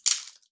Instrument samples > Percussion
Cellotape Percussion One Shot3
sample, sound, drum, shots, shot, organic, ambient, texture, glitch, samples, adhesive, unique, found, pack, sounds, cellotape, one, foley, design, creative, tape, experimental, electronic, IDM, DIY, layering, lo-fi, cinematic, percussion